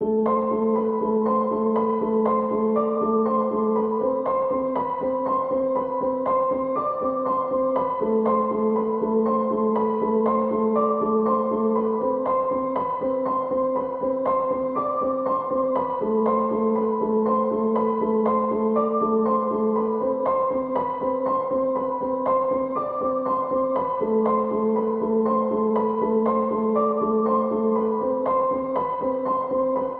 Music > Solo instrument
Piano loops 157 efect 4 octave long loop 120 bpm
Beautiful piano music . VST/instruments used . This sound can be combined with other sounds in the pack. Otherwise, it is well usable up to 4/4 120 bpm.
simple, pianomusic, loop, samples, 120bpm, simplesamples, piano, free, 120, reverb, music